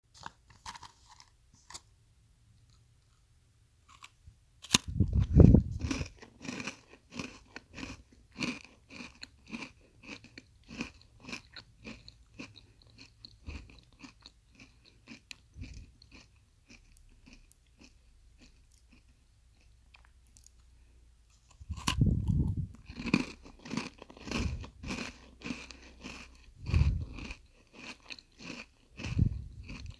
Sound effects > Human sounds and actions
Eating cookie 01
biscuit, chewing, cookie, crunch, eat, eating, food, munch, snack